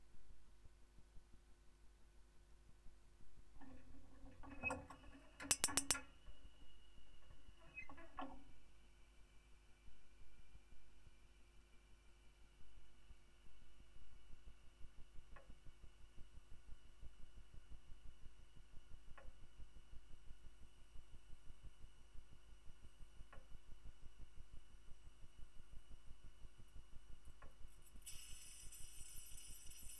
Sound effects > Objects / House appliances
A stereo pair of DIY piezo contact mics taped to the top of the kettle, on a gas stove. Zoom H1.